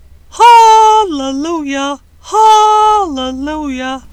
Speech > Solo speech
When something amazing happens to you, whether it be a stroke of luck or an absolutely awesome idea.